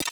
Instrument samples > Percussion
Glitch-Perc-Glitch Cymbal 3
Glitch, FX